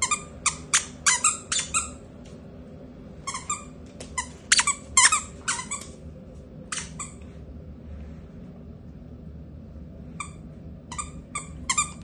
Sound effects > Objects / House appliances
Dog playing with a squeaky toy. Sounds like body movement and gas boiler in the background. iPhone 15 Pro video recordings extracted via Audacity 3.7.5.